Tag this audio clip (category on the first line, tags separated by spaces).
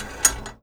Sound effects > Objects / House appliances
ball Blue-brand bingo bingo-cage Blue-Snowball slide